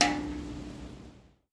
Sound effects > Objects / House appliances
METLImpt-Samsung Galaxy Smartphone, CU Back Porch Railing Hit 01 Nicholas Judy TDC
Metal back porch railing hit.
hit, metal, Phone-recording